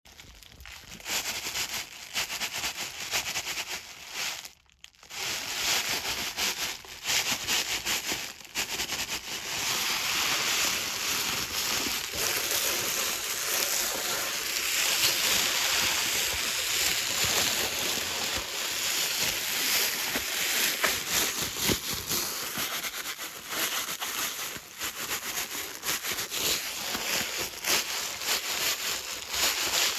Sound effects > Objects / House appliances
Soft Plastic Wrap Crunching ASMR
I think I found some plastic wrap/foil and tried to make somewhat musical, expressive noise with it. I loved the texture of this, it's not super harsh or loud like many plastic backs and packagings.